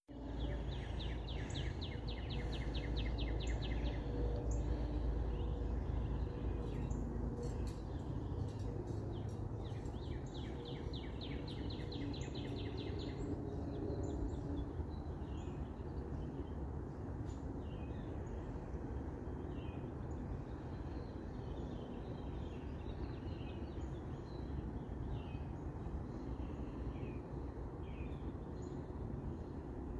Soundscapes > Nature
Birds singing with distant jets and quiet air.